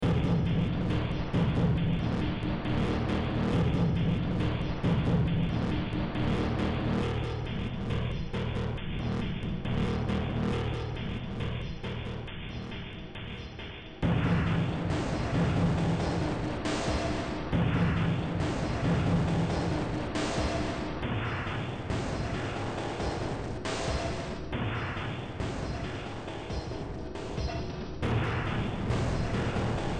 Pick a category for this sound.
Music > Multiple instruments